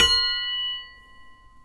Sound effects > Other mechanisms, engines, machines
metal shop foley -080
wood, oneshot, thud, sound, sfx, perc, pop, boom, percussion, shop, strike, bam, little, metal, tink, crackle, tools, foley, fx, bang, bop, knock, rustle